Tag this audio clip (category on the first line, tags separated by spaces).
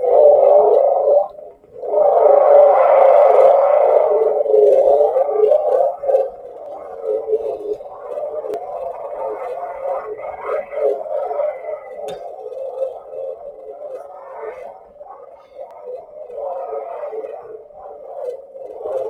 Soundscapes > Other
alien feedback ambient